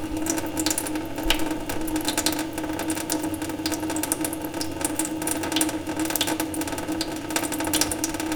Sound effects > Objects / House appliances
Water dripping into the sink recorded with contact microphone
contact, dripping, leaf-audio, low-frequency, microphone, sink, water